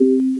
Sound effects > Electronic / Design
note C blip electro
from a scale of notes created on labchirp for a simon-type game of chasing sounds and flashes.
scales, single-note, blip, electro, tonal